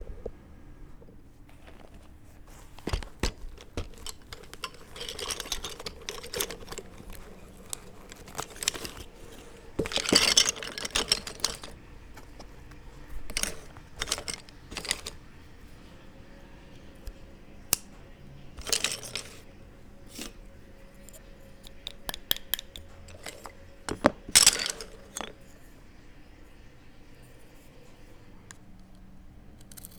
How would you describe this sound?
Soundscapes > Indoors
تراشین قلم و نوشتن Persian Calligraphy and Preparing the Calligraphy Pen
She then records how the pen sounds like while doing calligraphy and writing. This recording was part of the Kativa project, a sonic heritage project, sets of field recorders that travel person by person throughout and possibly beyond Iran to collectively record sounds.
persian, Iran, writing, Calligraphy, Trimming